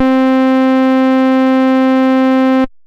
Instrument samples > Synths / Electronic
02. FM-X ALL 1 SKIRT 6 C3root
FM-X, Montage, MODX